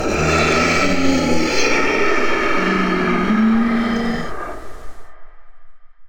Sound effects > Experimental
Creature Monster Alien Vocal FX (part 2)-040
fx, dripping, howl, demon